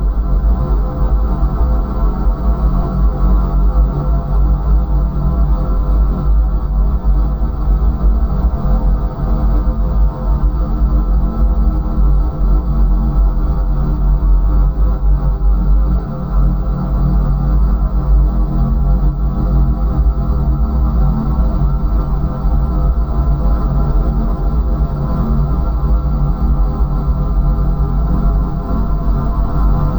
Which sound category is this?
Sound effects > Experimental